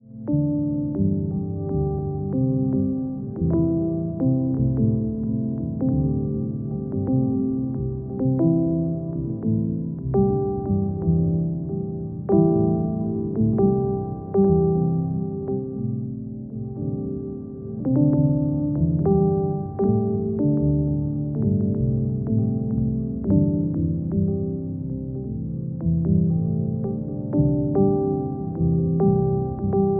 Soundscapes > Synthetic / Artificial
Botanica-Granular Ambient 16-Slowed
Ambient, Atomosphere, Beautiful, Botanica